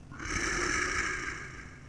Sound effects > Animals
A monster growl | Recorded with a Blue Yeti Nano and edited with Audacity.